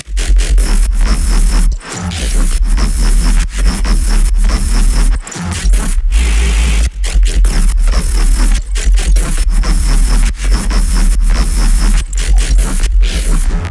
Instrument samples > Synths / Electronic
Bass loop 140 Random
bass, synth, dubstep